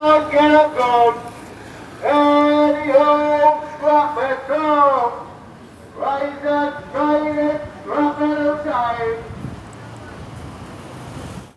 Soundscapes > Urban
Splott - Any Old Scrap Metal - Habershon Street
Recorded on an iPhone SE.
splott, wales